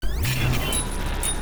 Sound effects > Electronic / Design
One-shot Glitch SFX with a mechanical Feel.